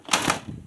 Sound effects > Other
Plastic colliding. Recorded with my phone.